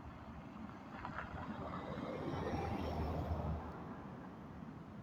Sound effects > Vehicles
car driving by
A car passing by on tarmac
car, drive